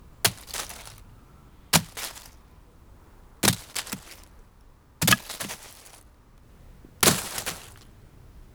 Sound effects > Natural elements and explosions
falling cone leaves powerful
Falling cone to the leaves heavily